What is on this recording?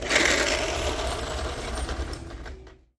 Sound effects > Objects / House appliances
TOYMech-Samsung Galaxy Smartphone, CU Car, Drive Away Nicholas Judy TDC
A toy car driving away. Recorded at Goodwill.